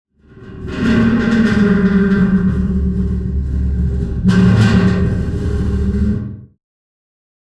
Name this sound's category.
Sound effects > Other